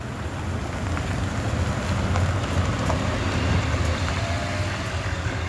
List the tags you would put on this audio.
Sound effects > Vehicles
vehicle bus

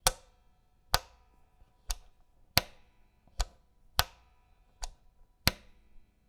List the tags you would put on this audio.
Sound effects > Objects / House appliances
field-recording guitar pick tac tic tic-tic wood

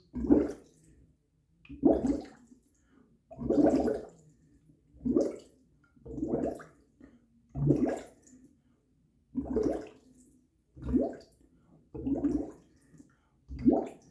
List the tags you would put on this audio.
Sound effects > Natural elements and explosions
bubbles glug multiple Phone-recording short water

